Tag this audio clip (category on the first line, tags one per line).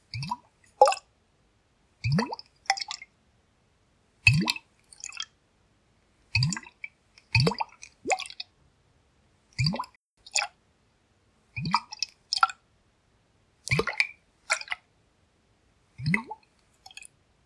Sound effects > Objects / House appliances
alcohol
bar
bartender
beverage
bottle
carafe
cocktail
drink
flask
full
liquid
mixing
porto
shake
shaking
spirit
spirits
spirituous
stir
water
wine